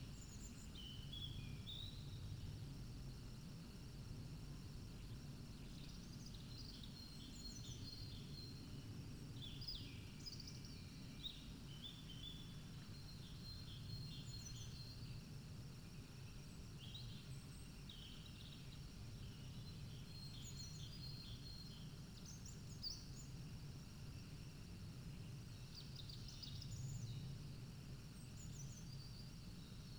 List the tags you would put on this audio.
Soundscapes > Nature
Dendrophone field-recording natural-soundscape phenological-recording raspberry-pi soundscape weather-data

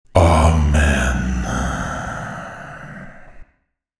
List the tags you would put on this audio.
Speech > Solo speech
Amen creepy deep demon eerie father horror phantom preist religious scary sinister spooky

